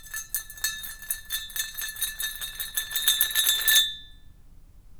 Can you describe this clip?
Sound effects > Other mechanisms, engines, machines
metal shop foley -233
rustle, sound